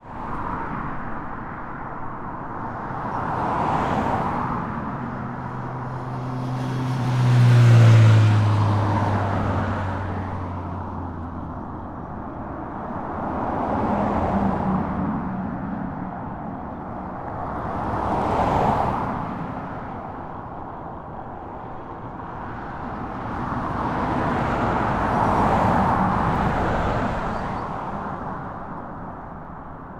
Soundscapes > Urban
AMBTraf Cars and Motorcycle
Cars and motorcylce passing left to right and right to left on a suburban road. Recorded on a Zoom H6e pointed at 90 degrees to the traffic flow.
cars, traffic, car, field-recording, motor, motorcycle, road, street